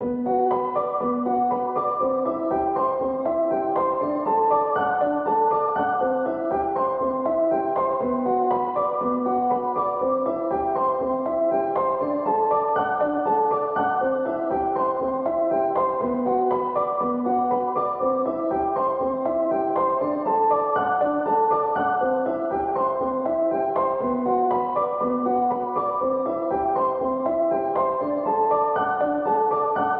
Music > Solo instrument
Piano loops 145 efect 4 octave long loop 120 bpm
free, loop, music, piano, pianomusic, reverb, samples, simple, simplesamples